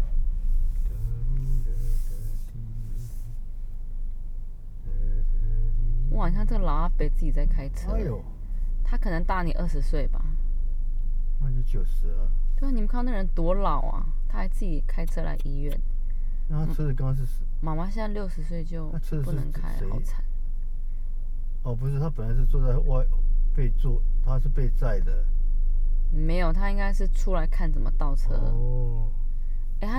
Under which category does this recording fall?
Soundscapes > Urban